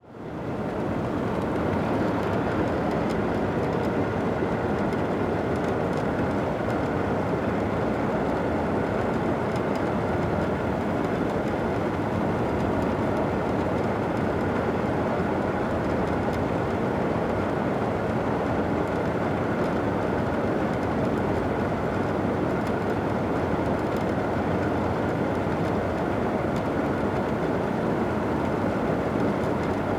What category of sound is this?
Sound effects > Other mechanisms, engines, machines